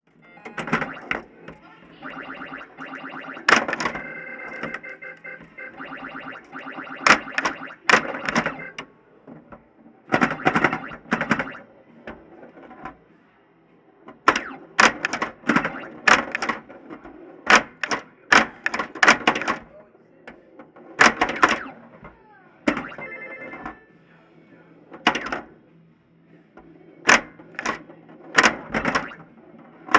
Sound effects > Other mechanisms, engines, machines
Pinball Game Machine with contact mic
A mono recording of the internal noises of an old pinball game machine in a bar. Recorded with Metal Marshmellow Pro contact mic Zoom F3 field recorder
analog, contactmic, transient, mechanical, noise, sfx, soundeffect, soundfx, pinball, machine, contact, sounddesign, microphone, fieldrecording, gamefx, gamenoise